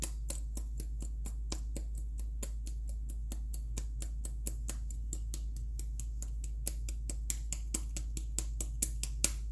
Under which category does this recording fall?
Sound effects > Objects / House appliances